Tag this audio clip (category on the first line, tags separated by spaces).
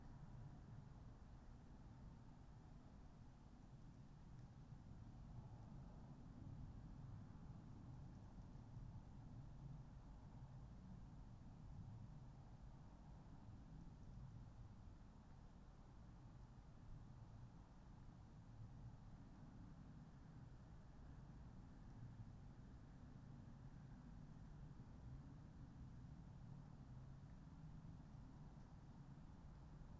Soundscapes > Nature
alice-holt-forest data-to-sound Dendrophone field-recording modified-soundscape phenological-recording raspberry-pi weather-data